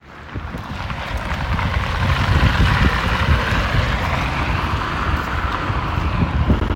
Soundscapes > Urban
Car passing Recording 38
Cars, vehicle, Road